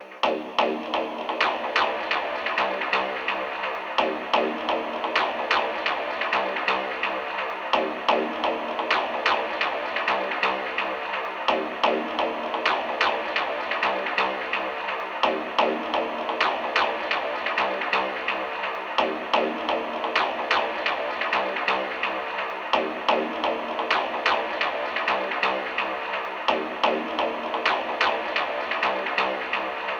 Instrument samples > Synths / Electronic
Techno Synth Chords 002
128bpm, chords, loop, synth, techno